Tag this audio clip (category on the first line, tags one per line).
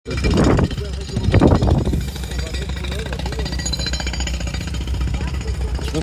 Sound effects > Other mechanisms, engines, machines
construction
noise
work